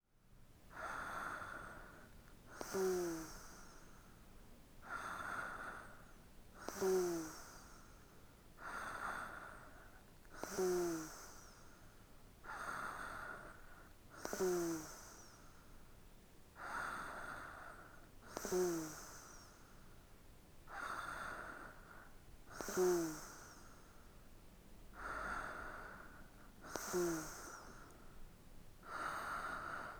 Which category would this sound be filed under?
Sound effects > Human sounds and actions